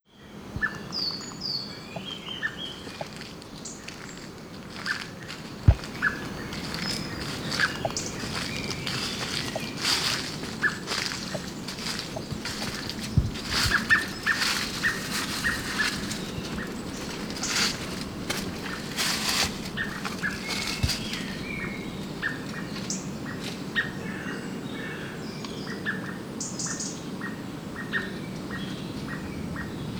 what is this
Soundscapes > Nature
030 BAMBOO BLACKBIRD BIRDS WIND
bamboo, birds, blackbird, wind